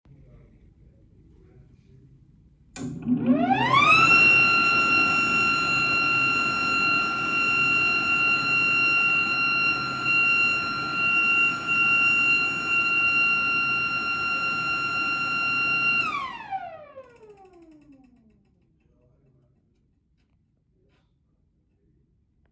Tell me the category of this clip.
Sound effects > Other mechanisms, engines, machines